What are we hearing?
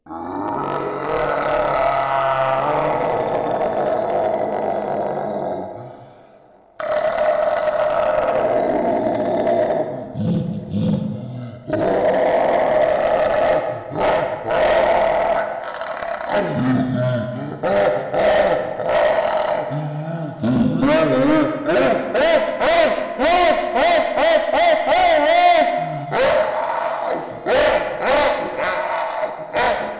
Speech > Processed / Synthetic
Demonic beast roars. Slowed-down roars and wild chimpanzee imitation performed by a human, followed by two repeated series of snorting.